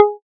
Instrument samples > Synths / Electronic
APLUCK 4 Ab
additive-synthesis, fm-synthesis, pluck